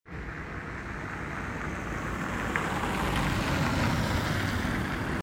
Sound effects > Vehicles
A car passing by in Tampere, Finland. Recorded on an iPhone 16's built-in microphone. This clip is recorded for the COMP.SGN.120 Introduction to Audio and Speech Processing course project work in Tampere University.